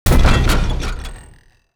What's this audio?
Sound effects > Other
Sound Design Elements Impact SFX PS 084
strike, rumble, percussive, game, impact, design, smash, sharp, cinematic, transient, heavy, blunt, collision, thudbang, effects, shockwave, audio, force, hard, sound, power, sfx, explosion, hit, crash